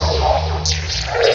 Soundscapes > Synthetic / Artificial
LFO Birdsong 34
lfo, massive, bird